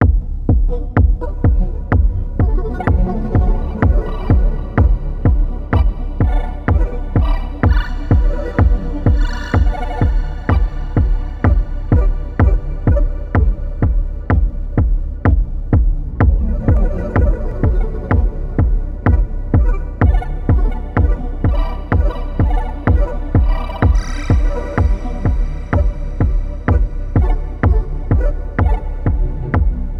Instrument samples > Synths / Electronic
Ambient Drum Loop at 120bpm with Granular on Top
Drum loop with variable granular on top Done with Torso S4
120-bpm, 120bpm, beat, drum, granular, loop, loopable, percussion-loop, rhythm